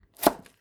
Sound effects > Other
Quick vegetable chop 13
Potato being quickly chopped with a Santoku knife in a small kitchen.
Chop, Kitchen, Vegetable, Chief, Home, Cooking